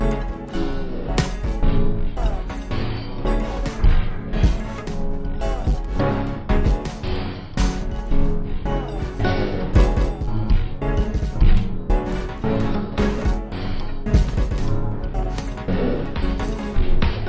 Music > Multiple instruments
Off Beat Resampled Song, Granular Synthesis
Originally a song made by me for a school assignment, resampled using Ableton's granular synth II to create this awkward pattern.
Granular, Strange, Unusual, Weird